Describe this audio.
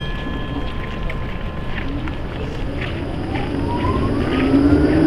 Sound effects > Vehicles
Tram00045096TramDeparting
Tram departing from a nearby stop. Recorded during the winter in an urban environment. Recorded at Tampere, Hervanta. The recording was done using the Rode VideoMic.
city, transportation, field-recording, vehicle, winter, tramway, tram